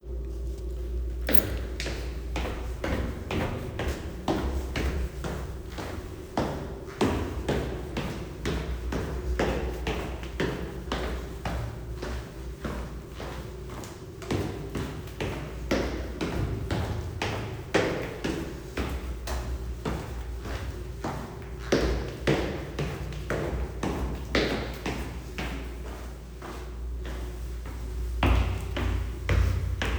Sound effects > Human sounds and actions
Walking Down Apartment Staircase
Recording of person walking down apartment building staircase (and back up). Recorded August 31, 2025.
apartment, footsteps, staircase